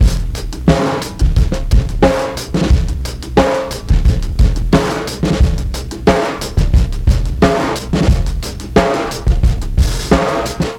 Music > Solo percussion
bb drum break loop hog 89
Vintage, Drum, Drum-Set, Dusty, Drums, Vinyl, Breakbeat